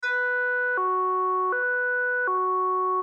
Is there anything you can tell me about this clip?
Sound effects > Vehicles
European Police siren 1
Symthesized German/EU police/emergency vehicle siren sound.
alert, de, german, police